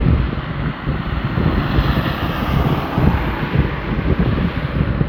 Soundscapes > Urban
Car passing by 3
Car passing by, recorded with a mobilephone Samsung Galaxy S25, recorded in windy and rainy evening in Tampere suburban area. Wet asphalt with a little gravel on top and car had wintertyres